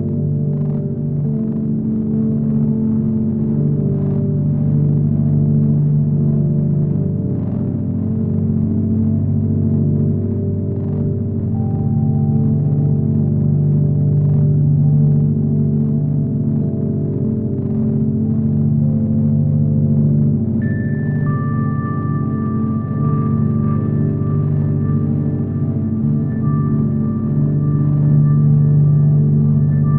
Music > Other
The wind blew my plant over today, it's ok.
pad, loop, ambient, dreamy, soundscape, rhodes, glitch, drone